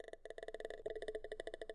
Sound effects > Animals
Creature clicking Recorded using my microphone and edited in Audacity
dog, wolf